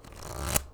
Sound effects > Objects / House appliances
GAMEMisc-Blue Snowball Microphone Cards, Shuffle 09 Nicholas Judy TDC
cards, foley, Blue-brand, Blue-Snowball